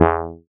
Instrument samples > Synths / Electronic
MEOWBASS 2 Ab
fm-synthesis, additive-synthesis, bass